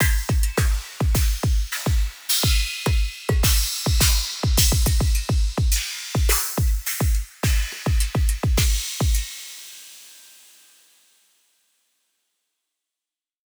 Music > Multiple instruments
105bpm Cymbolic Rezidool Dark FX Beat Loop
A collection of electronic beats and loops with bass and other instrumentation , Hip Hop grooves, subby chops and Percussion mixed with FL Studio and a ton of effects processing, processed in reaper
Bass, Bassloop, Beat, Beats, Chill, Downtempo, EDM, Funky, FX, Groove, Groovy, Heavy, Hip, HipHop, Hop, IDM, Loop, Loops, Melodies, Melody, Perc, Percussion, Sample, Soul, Sub, Subloop, TripHop, Trippy